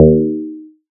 Instrument samples > Synths / Electronic
FATPLUCK 1 Eb
fm-synthesis, additive-synthesis, bass